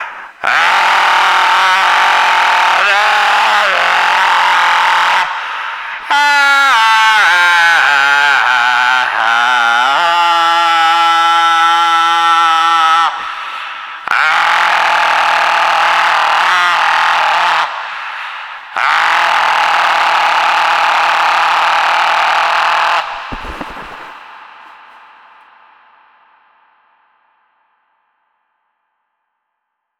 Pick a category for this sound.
Speech > Other